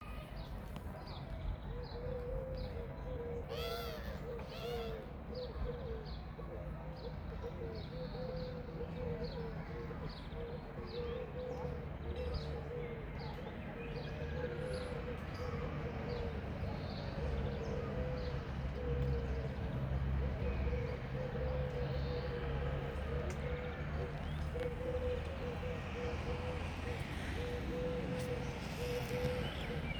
Soundscapes > Urban
Tarragona 13 May 2024 ext ambience
Sounds of pigeons or doves cooing, birds cawing and tweeting, and distant traffic in Tarragona, Spain in 2024. Recording device: Samsung smartphone.
birds, distant-traffic, doves, doves-cooing, pigeons, Spain, Tarragona